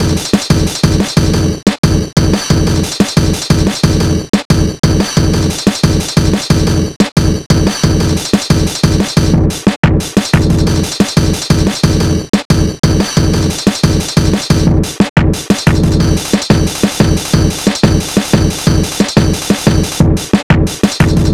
Music > Solo percussion
intro beat - Sewerpvsher
an intro beat made for my song "Sewerpvsher", made with bandlab drum machine